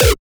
Instrument samples > Percussion
Frechcore Punch Testing 1
使用的采样：FPC Kick 1，Grv Kick 25和21，来自Flstudio原始采样包的Ekit踢鼓。用Coda、ZL EQ、Waveshaper处理。并从Flstudio采样器中调整了很多弹跳和音高量。
Kick, Hardstyle, Punch, Hardcore, Frechcore